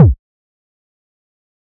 Instrument samples > Percussion
8 bit-Kick3
percussion, game, FX, 8-bit